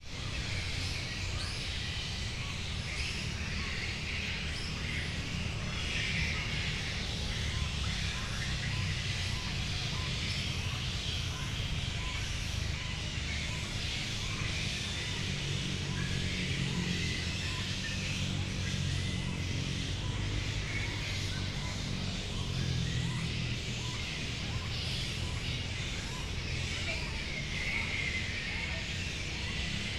Soundscapes > Urban
Hundreds of flying foxes (acerodon jubatus) at Puerto Galera. (take 5) I made this recording at dusk, in Puerto Galera (Oriental Mindoro, Philippines) while hundreds (maybe thousands ?) flying foxes were screaming and flying. In the background, one can hear some noise and hum from the town. Recorded in July 2025 with a Zoom H5studio (built-in XY microphones). Fade in/out applied in Audacity.